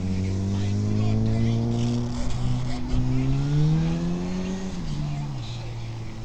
Soundscapes > Urban
Car passing street preacher, reving. Recorded on portable audio recorder (raw audio)